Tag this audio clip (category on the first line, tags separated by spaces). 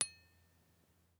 Sound effects > Other mechanisms, engines, machines
sample garage blub light-bulb